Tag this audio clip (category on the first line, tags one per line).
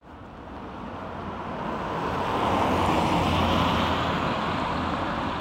Soundscapes > Urban
car
vehicle
traffic